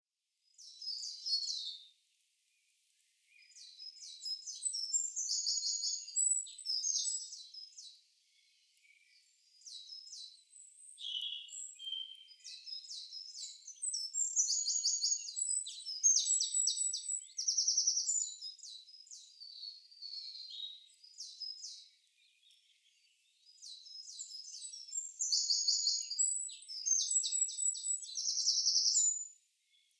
Soundscapes > Nature
A recording from Hopwas woods. Edited using RX11.